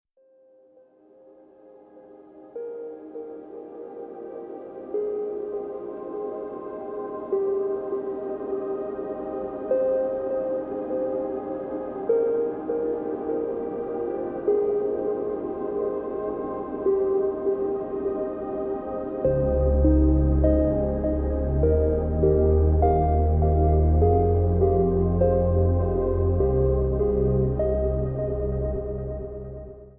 Music > Multiple instruments
Melancholic Background
Warm, nostalgic ambient music inspired by autumn landscapes ideal for cinematic storytelling, emotional, or reflective scenes.
ambient, atmosphere, background, calm, cinematic, cozy, emotional, melancholic, nostalgic, peaceful, reflective